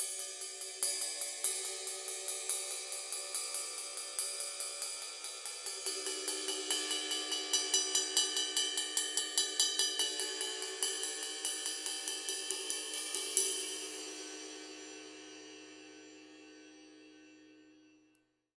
Music > Solo instrument
Paiste Custom 22inch Ride rhythm-004
Crash, Custom, Cymbal, Cymbals, Drum, Drums, FX, GONG, Hat, Kit, Metal, Oneshot, Paiste, Perc, Percussion, Ride, Sabian